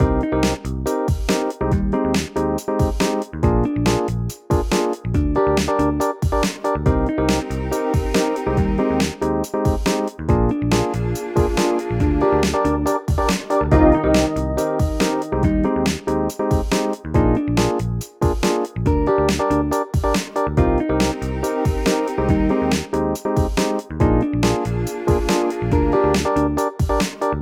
Music > Multiple instruments
groovy loop
a simple loop made in fl studio
groovy, drums, percussion-loop, guitar